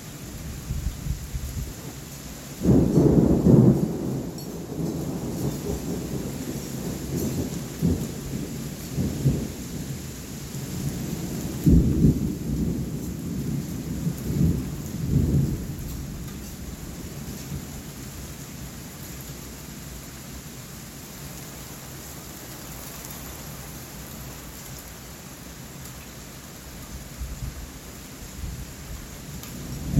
Soundscapes > Nature
Very heavy thunder and rainstorm with huge booms and rumbles.